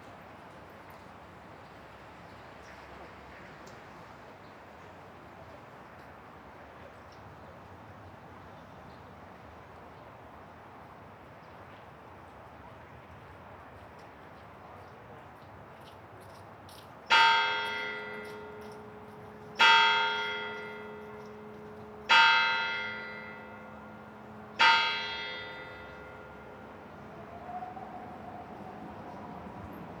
Soundscapes > Other
Bell chimes of St. John’s Church (Jaani Kirik) in Tallinn, Estonia. Recorded at 11:00 on January 20, 2026. Recorded using a Sound Devices MixPre-6 II and a Schoeps MiniCMIT GR microphone. N.Asst was set to -7 dB. The recording was made outdoors in front of St. John’s Church at Vabaduse Square, Tallinn. Air temperature at the time of recording was -7°C. ### 🇪🇪 Eesti keeles Tallinna Jaani kiriku kellamäng kell 11:00 20.jaanuaril 2026.a. Sound Devices MixPre-6 II; Schoeps MiniCMIT GR N.Asst oli -7 dB Temperatuur väljas -7 kraadi C Tallinnas, Jaani kiriku ees Vabaduse väljakul.
bells, chimes, ambien, bell